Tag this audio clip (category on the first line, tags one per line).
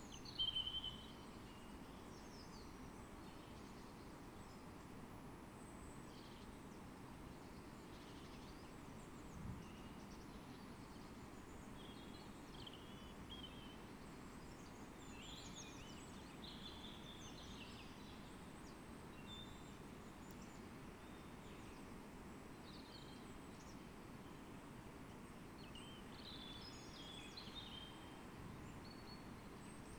Soundscapes > Nature
alice-holt-forest
data-to-sound
sound-installation
raspberry-pi
Dendrophone
field-recording
modified-soundscape
soundscape
phenological-recording
artistic-intervention
weather-data
nature
natural-soundscape